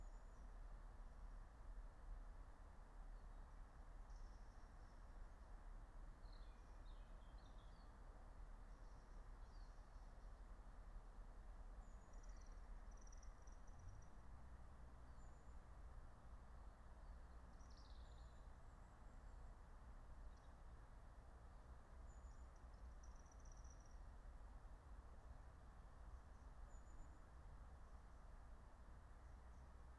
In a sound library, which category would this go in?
Soundscapes > Nature